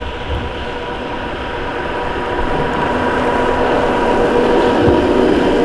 Sound effects > Vehicles
Tram00085968TramPassingBy
tram, vehicle, winter, transportation, city, field-recording, tramway